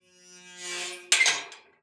Sound effects > Other
A recording of a Metal gate being pulled. Edited in RX 11.
creaking, outdoor, gate, high